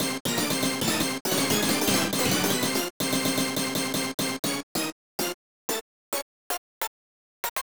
Music > Solo instrument
Absolute Random Orchestra Hits
I just made this to make it, had especially fun with this one haha.
chaotic, orchestra-hit, random-rhythm